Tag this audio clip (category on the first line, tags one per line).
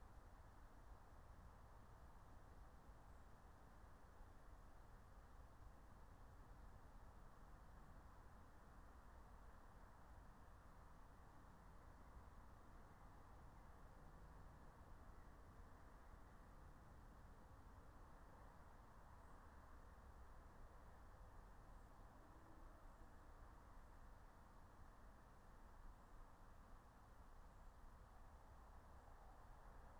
Soundscapes > Nature
natural-soundscape
nature
phenological-recording
raspberry-pi
soundscape